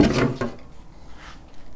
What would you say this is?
Sound effects > Other mechanisms, engines, machines
Handsaw Oneshot Metal Foley 8
percussion, perc, metal, sfx, fx, vibe, twang, foley, tool, shop, household, vibration, twangy, handsaw, saw, plank, hit, metallic, smack